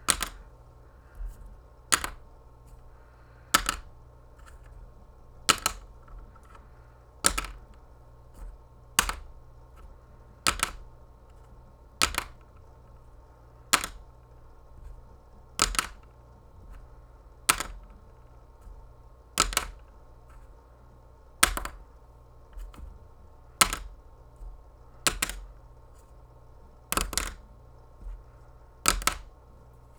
Sound effects > Objects / House appliances
OBJWrite-Blue Snowball Microphone, CU Pencil, Drop Nicholas Judy TDC
A pencil dropping.
drop, Blue-Snowball, Blue-brand, foley, pencil